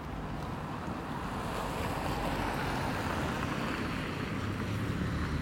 Soundscapes > Urban
Audio of car passing by. Location is Tampere, Hervanta. Recorded in winter 2025. No snow, wet roads, not windy. Recorded with iPhone 13 mini, using in-built voice memo app.
car, vehicle